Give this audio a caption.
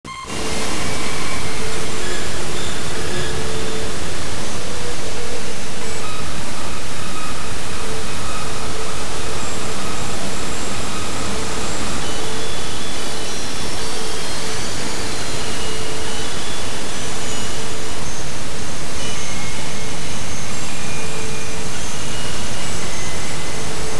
Sound effects > Electronic / Design
Ghost Grain Scratch 3
A sample of our blade sharpener is explored. This is an abstract noisy sample pack suitable for noise, experimental or ambient compositions.